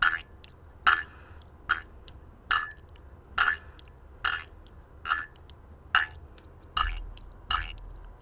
Sound effects > Objects / House appliances
TOONBoing-Blue Snowball Microphone, CU Jaw Harp Boinks, Comical Water Drips Nicholas Judy TDC
Jews harp boinks. Comical water drips.